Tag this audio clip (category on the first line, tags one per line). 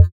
Instrument samples > Synths / Electronic
fm-synthesis
bass